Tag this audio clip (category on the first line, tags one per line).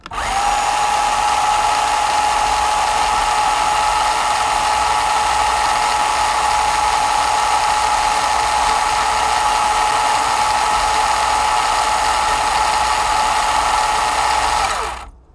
Sound effects > Other mechanisms, engines, machines
rc
motor
Blue-brand
Blue-Snowball
car